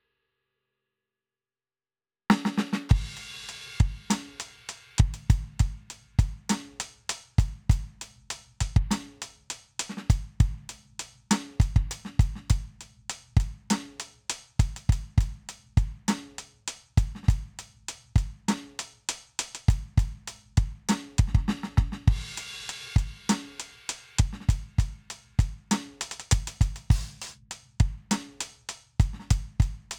Music > Solo instrument
A 100Bpm drum track with the cassette Kit on Edrum